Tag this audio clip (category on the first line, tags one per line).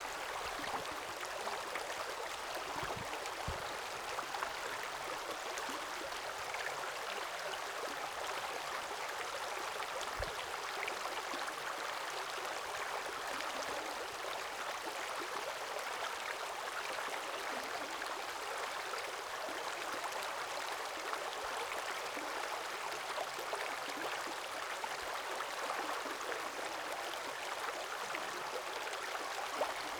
Soundscapes > Nature
liquid
stream
water
flow
flowing
brook